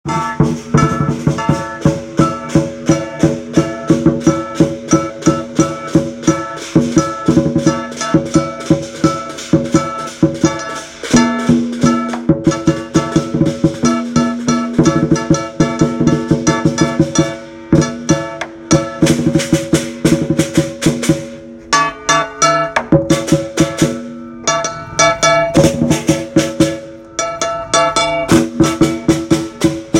Music > Multiple instruments
Múa Lân 5 - 麒麟 Qí Lín 5
Musica for kỳ lân dance (麒麟 qí lín). Record use iPhone 7 smart phone. 2025.05.12 07:46
dance, music, qi-lin